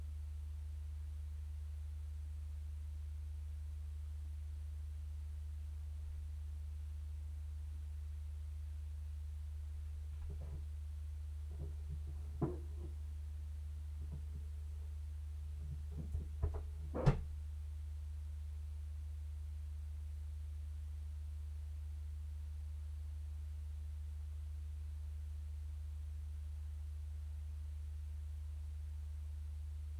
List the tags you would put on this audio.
Sound effects > Animals
21410
cat
cat-flap
Cote-dor
DJI
Dji-mic3
door
flap
France
Gergueil
MIC-3
omni
pet-door
single-mic

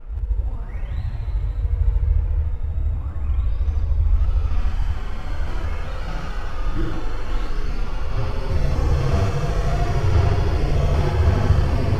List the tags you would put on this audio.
Sound effects > Electronic / Design
vst
dark-techno
dark-soundscapes
scifi
drowning
noise-ambient
noise
mystery
dark-design
cinematic
content-creator

